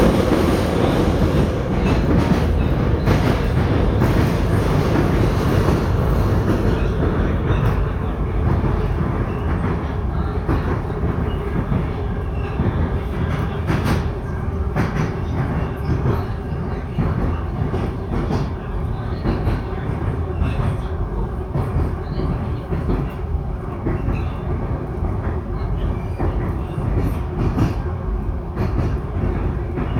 Sound effects > Vehicles
subway Train in Kiyv
recorded on Xaiomi 14 3:51 Open door
metro, station, subway, train, underground